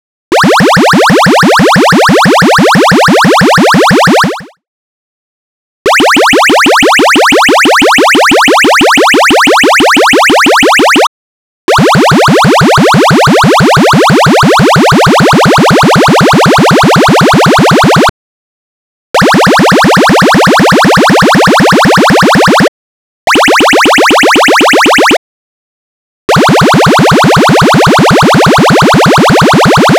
Instrument samples > Synths / Electronic
Dub siren (Sawtooth wave LFO) Roland S1
Using my Roland S1 and a sawtooth wave LFO modulating the pitch and some other tweaks. Date YMD : 2025 December 30 Location : France. Hardware : Roland S1 audio over USB to the computer. Weather : Processing : Trimmed sliced and normalised in Audacity.
dub, LFO, Roland, Roland-S1, S1, saw-osc, sawtooth, saw-tooth-lfo, single-instrument, siren, synth